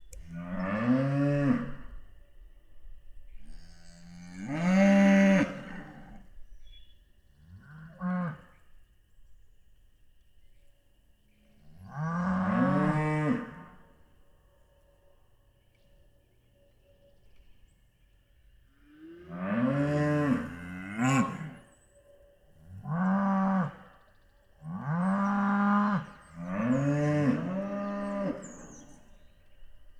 Sound effects > Animals
Restless Cattle Mooing
Some Angus cows and bulls in a mooing frenzy on family farm in the Ozarks, frantic because they were temporarily split up. Recorded maybe 20-30 meters away from the herd with Clippy EM272s into a Zoom H1n. Some light de-noise processing applied, but more is needed if you want to completely isolate the moos from the birds and frogs in the background.
animal, rural, moo, herd, field-recording, barn, nature, zoom, pasture, bird, missouri, mooing, country, cattle, ox, cow, em272, countryside, field, izotope, grazing, steer, cows, farm, ozark, bull, frog, h1n